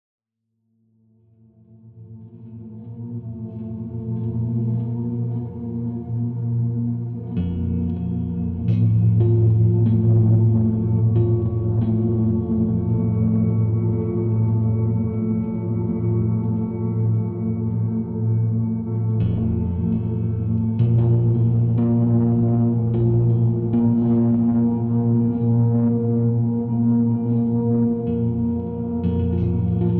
Music > Other
I could have done so much more.